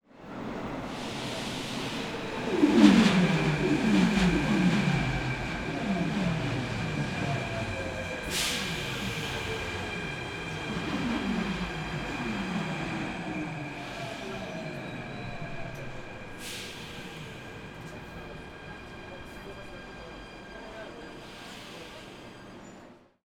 Sound effects > Vehicles
Recorded with Zoom H6 XY-Microphone. Location: Athens / Greece; standing in the middle of the subway station